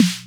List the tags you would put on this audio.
Instrument samples > Synths / Electronic
Vintage Kit Analog Electronic DrumMachine SnareDrum music Bass Synth Mod Drum 606 Modified Snare

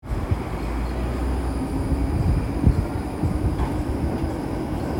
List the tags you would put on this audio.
Sound effects > Vehicles
traffic city Tampere tram

